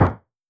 Sound effects > Human sounds and actions
LoFiFootstep Gravel Walking-07
Shoes on gravel, walking. Lo-fi. Foley emulation using wavetable synthesis.
footstep, footsteps, gravel, lofi, running, steps, synth, walk, walking